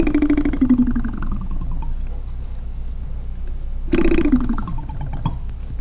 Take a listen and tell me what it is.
Sound effects > Objects / House appliances
knife and metal beam vibrations clicks dings and sfx-084
Beam, Clang, ding, Foley, FX, Klang, Metal, metallic, SFX, ting, Vibrate, Vibration, Wobble